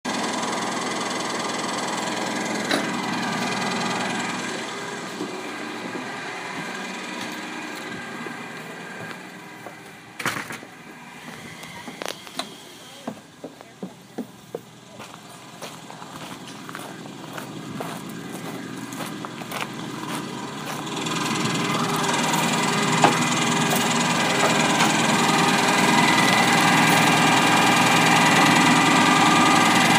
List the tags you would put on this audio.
Soundscapes > Other
field-recording outhouse vacuum-truck pump truck marsh-lake yukon septic

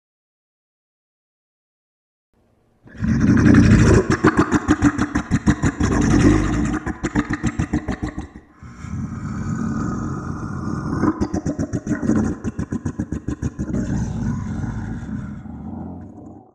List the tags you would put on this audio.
Sound effects > Animals
cave Dragon deep dark echo gigantic reverb unearthly

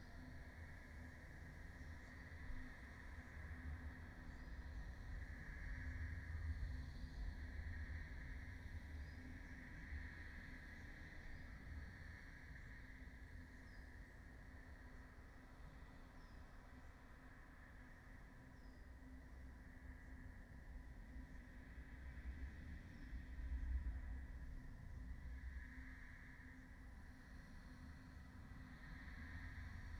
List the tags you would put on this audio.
Soundscapes > Nature
field-recording data-to-sound phenological-recording Dendrophone modified-soundscape natural-soundscape nature artistic-intervention weather-data sound-installation soundscape alice-holt-forest

